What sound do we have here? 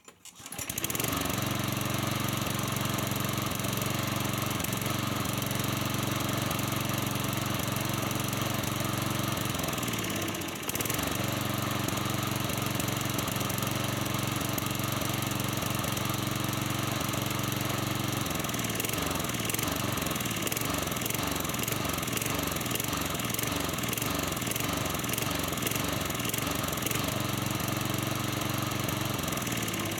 Sound effects > Other mechanisms, engines, machines
2007 era Briggs & Stratton Quantum 65 starting, sputtering, running and shutting off. This engine will most likely be parted (if there is anything good to part, honestly), since the valve seats are shot (hence the popping noises) and so is the cylinder. Recorded with my phone.
pop, sputter, engine, lawnmower, stall, lawn, run, starting, start, 4stroke, backfire, four-stroke, fourstroke, motor, machine, mower, stop